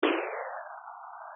Sound effects > Experimental
destroyed glitchy impact fx -005
impact, idm, zap, hiphop, percussion, impacts, glitchy, edm, perc, otherworldy, snap, crack, alien, pop, laser, sfx, clap, glitch, lazer, fx, whizz, experimental, abstract